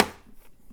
Sound effects > Other mechanisms, engines, machines
metal shop foley -199
bam, bang, boom, bop, crackle, foley, fx, knock, little, metal, oneshot, perc, percussion, pop, rustle, sfx, shop, sound, strike, thud, tink, tools, wood